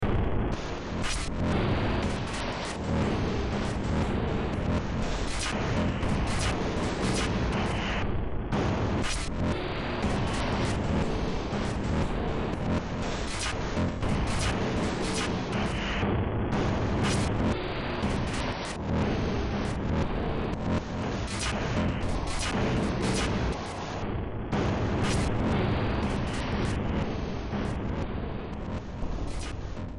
Music > Multiple instruments
Demo Track #3449 (Industraumatic)
Ambient, Cyberpunk, Games, Horror, Industrial, Noise, Sci-fi, Soundtrack, Underground